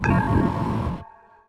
Sound effects > Other
combo wind dark
30 - Combined Wind and Dark Spells Sounds foleyed with a H6 Zoom Recorder, edited in ProTools together
wind, dark, combination, spell